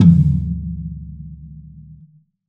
Instrument samples > Percussion
HR V10 Tom low
toms, physical-modelling, floor, machine, sample, tom